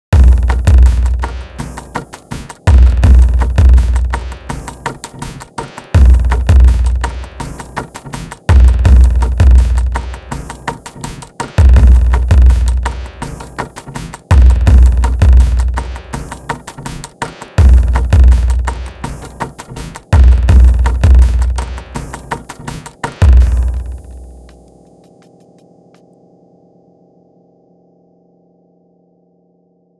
Music > Multiple instruments
BoomBoom Perc Loop (trip hoppy) 165bpm
a bangin weird lil perc loop i created using fl studio and processed with reaper
alien, quantized, breakdown, rhythm, percussion-loop, dance, idm, flstudio, beat, drumandbass, break, aphex, percs, afx, tribal, dub, groovy, jungle, grime, drums, loopable, loop, 165bpm, electro, drum-loop